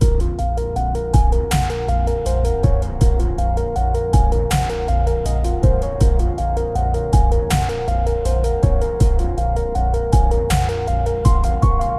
Music > Multiple instruments
Shadowmancer Loop (80 BPM, 4 bar)
4-bar, 80-bpm-loop, dark-beat, dark-edm, edm, SilverIlusionist, sneak-theme, stealth-game-loop, thief-beat